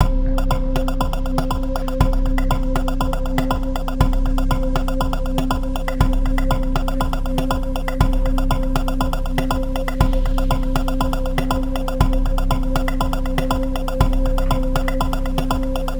Music > Multiple instruments
Drum Loop Suspense at 120bpm
A drum loop at 120bpm done with Digitakt 2 and samples from the factory library It conveys suspense
rhythm, industrial, beat, 120-bpm, drum, 120bpm, percussion-loop, drum-loop, loopable, modern